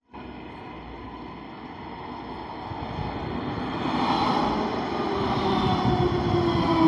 Sound effects > Vehicles
Sounds of a tram in wet, cool, and windy weather. Recorded using a mobile phone microphone, Motorola Moto G73. Recording location: Hervanta, Finland. Recorded for a project assignment in a sound processing course.
tram, drive